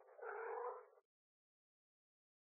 Sound effects > Animals
My cat Bailey meowing.